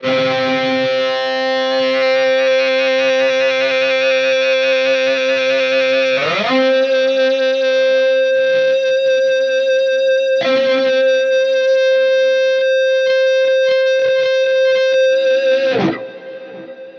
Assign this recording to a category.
Instrument samples > String